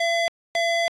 Sound effects > Electronic / Design
Elevator DoorsOpen
Made by me in Audacity for my game But i allow you to use them cuz it wasn`t too difficult to make this sound BEEP BEEP
beep, lift, Elevator